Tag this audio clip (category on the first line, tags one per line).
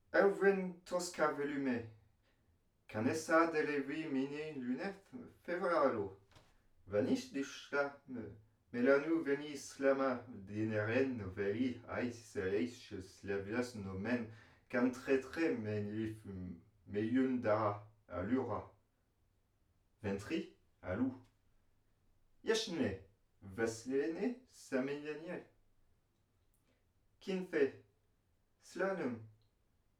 Speech > Other
mumbling
Rode
talking
Tascam
unintelligible
XY